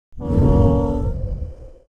Sound effects > Other
combo wind holy
29 - Combined Wind and Holy Spells Sounds foleyed with a H6 Zoom Recorder, edited in ProTools together